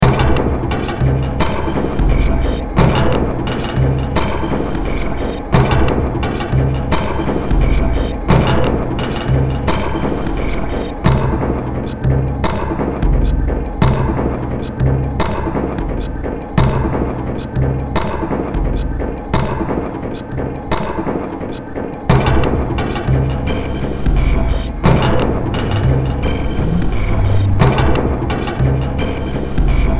Music > Multiple instruments
Demo Track #3667 (Industraumatic)
Ambient, Cyberpunk, Games, Horror, Industrial, Noise, Sci-fi, Soundtrack, Underground